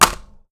Sound effects > Objects / House appliances
FOLYProp-Blue Snowball Microphone, CU Nintendo Switch Game Case, Fall, Drop to Floor Nicholas Judy TDC
A nintendo switch game case falling and dropping to the floor.